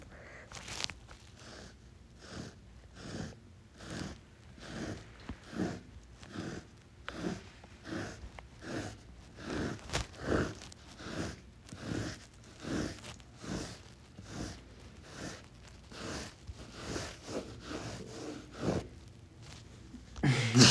Sound effects > Human sounds and actions
SNOWMisc footsteps snow MPA FCS2
footsteps in the snow
footstep, step, snow